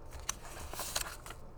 Sound effects > Objects / House appliances
OBJBook-Blue Snowball Microphone Comic Book, Page, Turn 01 Nicholas Judy TDC
Turning a page of a comic book.
Blue-brand, Blue-Snowball, comic-book, foley, page, turn